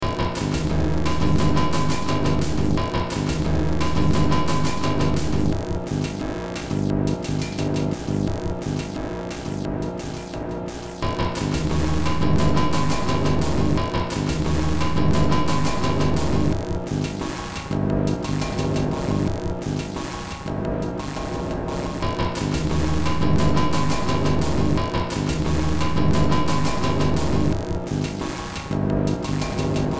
Music > Multiple instruments
Short Track #3720 (Industraumatic)
Games, Industrial